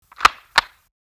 Sound effects > Other
gun reload
A reload for your gun.
shot, gun, gunshot, reload, weapon